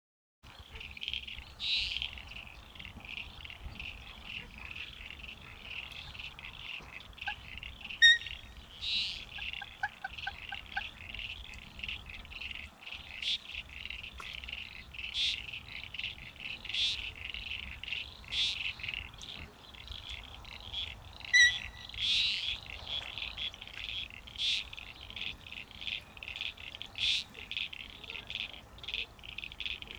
Soundscapes > Nature
AMBBird AMBSwmp Frogs Waterfowl BlackSwans
Ambient sounds of Frogs, water flown and Black Swans in an urban wetland around midday on a warm and overcast winters day. Processed in Reaper with iZotope noise reduction to remove distant traffic sounds.
ambient, waterfowl, field-recording, frogs, soundscape, ambience, atmosphere, wetlands, urban, swans